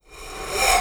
Sound effects > Electronic / Design
DSGNWhsh-Blue Snowball Microphone, CU Straw, Whoosh In Nicholas Judy TDC
A straw whooshing in.